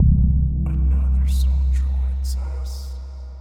Sound effects > Electronic / Design
Follower Sound - The Initiation
A spoken word sound effect in a whispered tone saying, "another soul joins us". This sound idea is meant for a streaming service to be used as a follower/sub addition and is suppose to feel like an initiation into a mysterious cult. Built entirely in FL Studio using native tools like 3xOsc and Flex. Features a deep ritual drone, faint whispers, and low-frequency resonance to evoke the feeling of crossing into the unknown. Designed for follower alerts, cinematic intros, or horror game ambience. Add the credit in your video description, game credits, or project page.
ritual-ambience, follower-alert, stream-sound